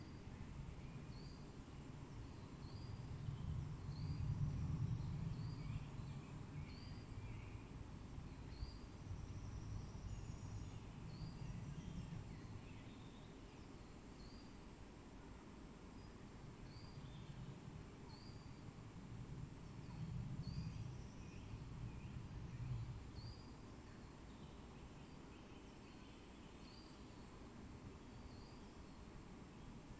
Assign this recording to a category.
Soundscapes > Nature